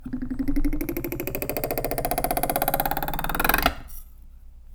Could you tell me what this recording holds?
Sound effects > Objects / House appliances
Metal Beam Knife Plank Vibration Wobble SFX 5
metal beam knife plank vibration on table, wobble, sfx
mETAL, SFX, PLANK, CLANK, vIBRATION, WOBBLE, METALLIC, bEAM, VIBRATE